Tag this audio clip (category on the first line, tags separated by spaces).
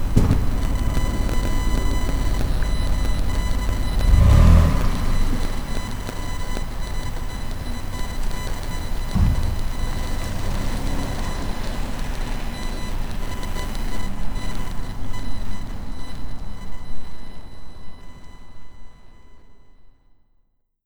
Sound effects > Vehicles
away car drive Tascam-brand Tascam-DR-05 Tascam-DR05 toyota-highlander